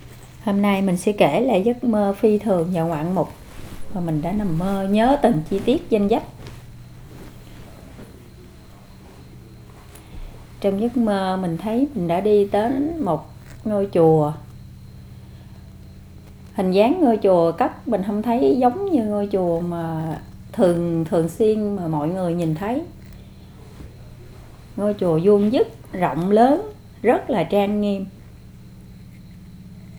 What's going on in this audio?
Speech > Solo speech
Ước Mơ Tứ Tư Kỳ Duyên 2024.12.17 04:50
Kỳ Duyên tell about her dream bẻoe she wake. Record use Zoom H4n Pro 2024.12.17 04:50
dream, female, viet, voice, woman